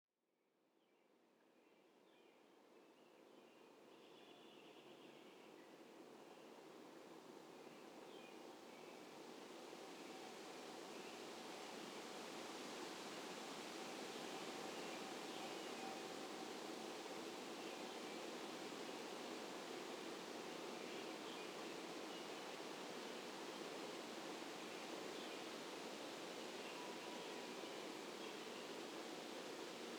Soundscapes > Nature
Early Morning Windchimes and Birds 51025
Audio recording of sounds taken from my condo's deck. It was a few hours before sunrise and the birds began to chirp. It was also windy so I managed to record a "soup" consisting of bird chirps, wind, and windchimes. Recorded with a Zoom H6 Essential . Edited in AVS Audio editor.